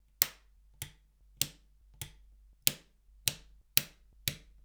Sound effects > Objects / House appliances
Flipping Light Switch 4
The sound of a light switch being flicked on and off. Recorded with a 1st Generation DJI Mic and Processed with ocenAudio